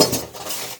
Instrument samples > Percussion
Recorded in Ricardo Benito Herranz Studio